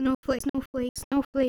Speech > Solo speech
Recorded with Smart Audio Recorder (Microsoft Edge Extension) by Terry Grove Snowflake 1 = Default Microphone (Realtek High-Definition Audio (SST)) Snowflake 2 = Communications (Realtek High-Definition Audio (SST)) Snowflake 3 = Microphone Array (Realtek High-Definition Audio (SST)) Original